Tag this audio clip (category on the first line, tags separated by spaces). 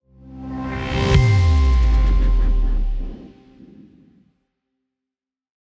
Sound effects > Electronic / Design
intro opening title punchy sound-design logo-intro cool